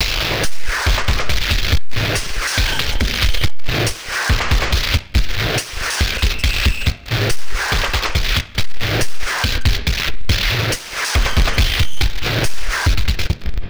Music > Solo percussion
Weird Drums
Blown out drum loop made using ZynAddSubFX with multiple layers of distortion, decimation, and a wah wah. The drums and screaming sound all come from the same synth track. If you can find out what to use this for, awesome.